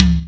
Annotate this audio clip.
Instrument samples > Percussion
This tom is part of the Tama Star Classic Bubinga Tomset (every tom is in my tom folder). I uploaded the attacked and unattacked (without attacks) files. → tom 1 (hightom): 9×10" Tama Star Classic Bubinga Quilted Sapele • tom 2 (midtom): 10×12" Tama Star Classic Bubinga Quilted Sapele • tom 3 (lowtom): 14×14" Tama Star Classic Bubinga Quilted Sapele • floor 1 (lightfloor): 16×16" Tama Star Classic Bubinga Quilted Sapele • floor 2 (deepfloor): 14×20" Tama Star Classic Bubinga Gong Bass Drum tags: tom tom-tom Tama-Star Tama bubinga sapele 16x16-inch 16x16-inches bubinga death death-metal drum drumset DW floor floortom floortom-1 heavy heavy-metal metal pop rock sound-engineering thrash thrash-metal unsnared Pearl Ludwig Majestic timpano
16x16-inch, 16x16-inches, bubinga, death, death-metal, drum, drumset, DW, floor, floortom, floortom-1, heavy, heavy-metal, Ludwig, Majestic, metal, Pearl, pop, rock, sapele, sound-engineering, Tama, Tama-Star, thrash, thrash-metal, timpano, tom, tom-tom, unsnared